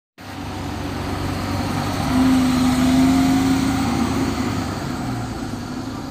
Sound effects > Vehicles
final bus 5
Bus Sound captured on iphone 15 Pro.
bus, finland, hervanta